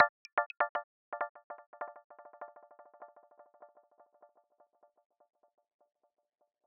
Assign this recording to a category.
Sound effects > Electronic / Design